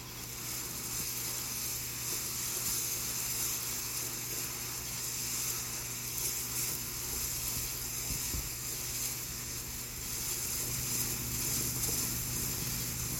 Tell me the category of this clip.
Sound effects > Natural elements and explosions